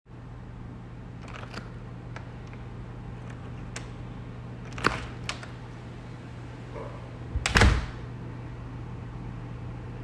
Sound effects > Objects / House appliances
cinema door closing opening sound
close, open